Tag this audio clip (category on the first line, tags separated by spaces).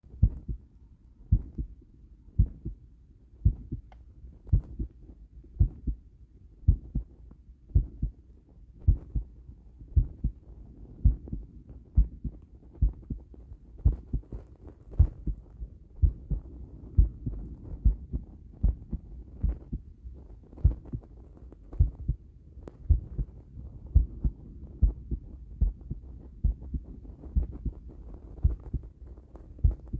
Sound effects > Human sounds and actions
heart heart-beat pattern heartbeat